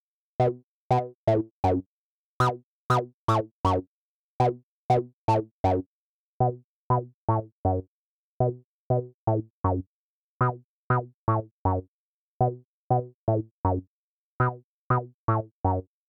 Music > Solo instrument
Acid loop recording from hardware Roland TB-03
303, Acid, electronic, hardware, house, Recording, Roland, synth, TB-03, techno